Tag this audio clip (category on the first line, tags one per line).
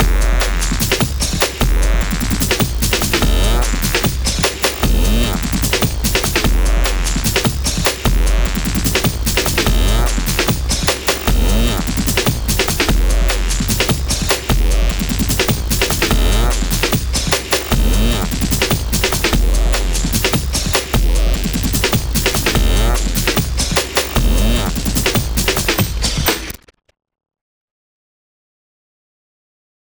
Music > Other
acid
breaks
jungle
loop
nosie